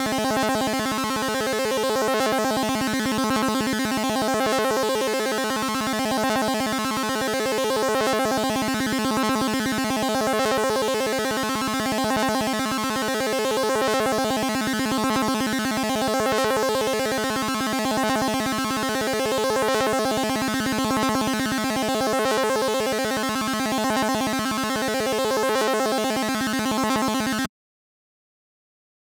Sound effects > Electronic / Design
Clip sound loops 4
Just easily FM a saw wave with a square wave. Synthsiser just phaseplant.
clip, fx